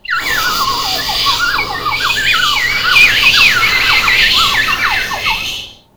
Sound effects > Electronic / Design
A spinning effect. A slide whistle twirling and windy swooshing.